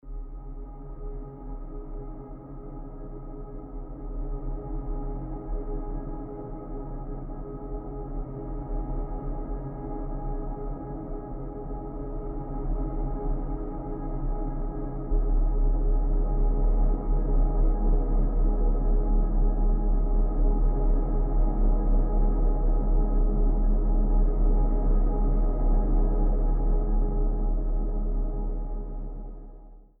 Soundscapes > Other
A chilling atmosphere, ideal for dark fantasy and supernatural settings. Perfect for creating suspense and tension in films, games, and videos, adding a haunting edge to your project.